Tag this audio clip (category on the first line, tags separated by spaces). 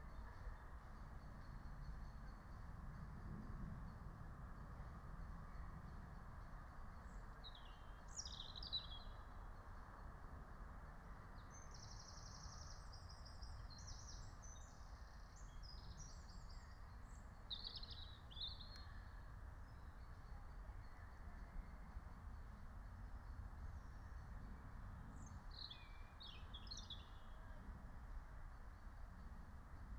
Soundscapes > Nature
raspberry-pi soundscape phenological-recording